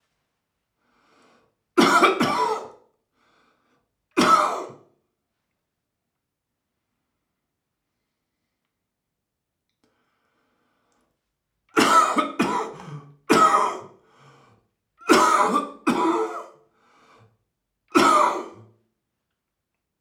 Sound effects > Human sounds and actions
Wheezy cough recorded with a Zoom H5studio.
cold, Zoom-H5s